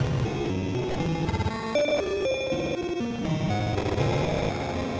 Music > Other
Unpiano Sounds 012
Distorted, Distorted-Piano, Piano